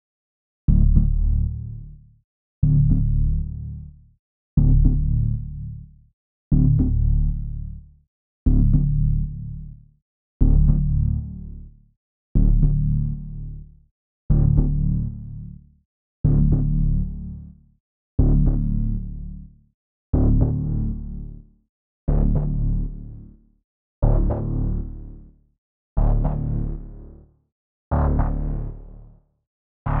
Instrument samples > Synths / Electronic
Bass D 123bpm
Hello, I synthesize these sounds in ableton. Use it.
techno, loop, electronic, bass, electro, synth